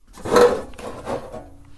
Sound effects > Other mechanisms, engines, machines
Woodshop Foley-079
knock, little, bam, fx, percussion, rustle, crackle, bang, bop, boom, oneshot, pop, foley, sound, tools, tink, sfx, strike, shop, thud, perc, wood, metal